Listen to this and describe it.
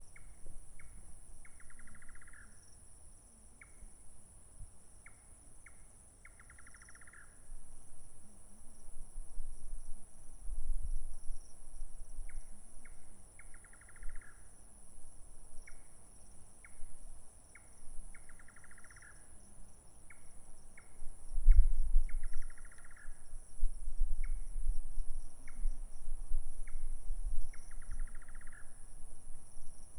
Soundscapes > Nature
Night Ambience, Cát Tiên, Vietnam (April 19, 2019)
Nocturnal soundscape recorded in Cát Tiên, Vietnam. Features insects, birds, and faint activity under the night sky.
Vietnam, insects, nocturnal, night, Ct, Ph, village, rural, ambience, ambient